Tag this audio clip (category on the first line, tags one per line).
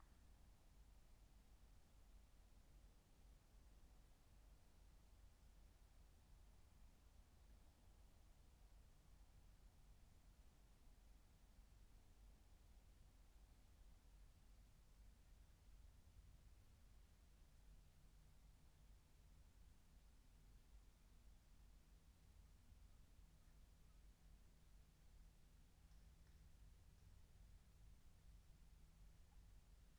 Soundscapes > Nature
phenological-recording
raspberry-pi
weather-data
soundscape
natural-soundscape
modified-soundscape
Dendrophone
alice-holt-forest
field-recording
sound-installation
data-to-sound
nature
artistic-intervention